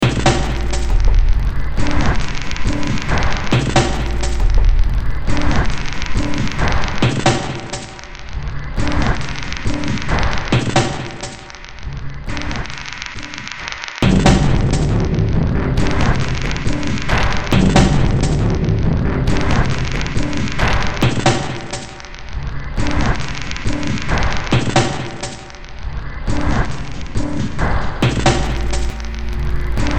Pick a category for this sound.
Music > Multiple instruments